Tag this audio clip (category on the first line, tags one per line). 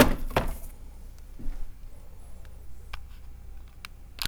Sound effects > Objects / House appliances
natural
oneshot
fx
perc
drill
foundobject
foley
metal
hit
glass
object
mechanical
bonk
clunk
fieldrecording
percussion
sfx
industrial
stab